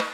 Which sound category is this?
Music > Solo percussion